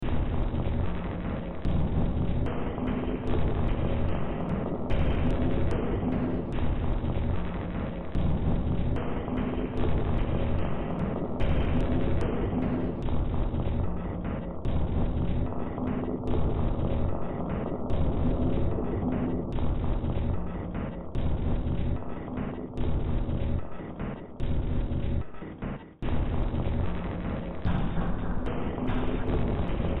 Music > Multiple instruments
Demo Track #3091 (Industraumatic)
Ambient, Cyberpunk, Games, Horror, Industrial, Noise, Sci-fi, Soundtrack, Underground